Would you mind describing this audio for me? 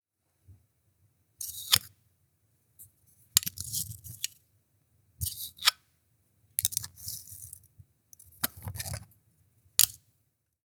Sound effects > Objects / House appliances
KITCH Cinematis CutleryForkPlastic PickUpPutDown DeskCeramic Slow 03 Freebie
A plastic fork striking a ceramic table slowly. This is one of several freebie sounds from my Random Foley | Vol. 3 | Cutlery pack. This new release is all about authentic cutlery sounds - clinks and taps on porcelain, wood, and ceramic.
Cutlery, effects, Foley, Fork, Freebie, handling, plastic, PostProduction, recording, SFX, Sound